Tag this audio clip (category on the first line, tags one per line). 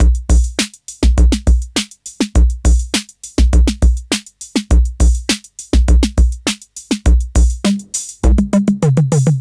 Music > Solo percussion
606
Analog
Bass
Drum
DrumMachine
Electronic
Kit
Loop
Mod
Modified
music
Synth
Vintage